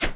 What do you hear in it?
Sound effects > Objects / House appliances
A recording of a badminton racquet being swung in front of a microphone.
air, badminton, fast, hard, movement, racquet, swipe, swish, whoosh